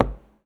Sound effects > Human sounds and actions
Sound created by me.